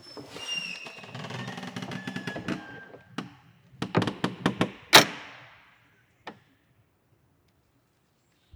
Sound effects > Objects / House appliances
DOORCreak-Closing Door Close Squeak Wooden Slow Heavy SFX
Wooden Door closing slowly, squeaking, snapping shut
Close, Creak, Wooden